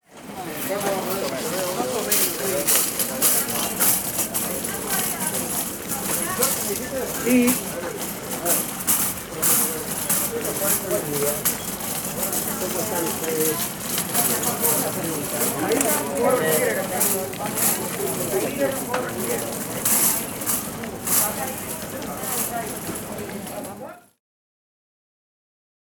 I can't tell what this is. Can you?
Soundscapes > Urban
Carrito percusion Feria de Salto Montevideo
A cart that moves fruits and vegetables at the Feria de Salto local market. A vibrant open-air market where you can find everything from fresh produce to antiques, reflecting the local culture and traditions. Recorded with a Tascam DR 03.
street, uruguay, people, montevideo, percussion, market, urban